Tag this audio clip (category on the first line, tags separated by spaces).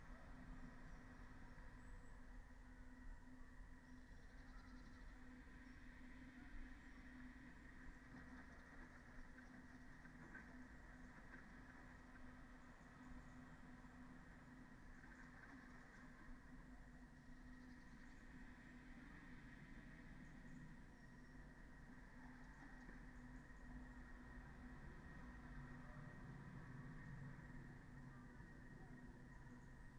Soundscapes > Nature
nature phenological-recording Dendrophone